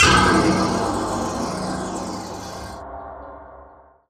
Instrument samples > Percussion
alien bassride 1 very long
ride alien fake bassride bell fakeride effect drum drums backing cymbal cymbals metal metallic Zildjian Sabian Meinl Paiste Istanbul Bosporus China clang clatter clangor clash resound chime
alien, backing, bassride, bell, Bosporus, chime, China, clang, clangor, clash, clatter, cymbal, cymbals, drum, drums, effect, fake, fakeride, Istanbul, Meinl, metal, metallic, Paiste, resound, ride, Sabian, Zildjian